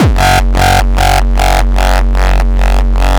Instrument samples > Percussion
Funny Kick 5 #F
A stupid kick synthed with phaseplant only.
Dance, Funny, Happy, Hardcore, Hardstyle, HDM, Kick, Party, rawstyle, Zaag, Zaagkick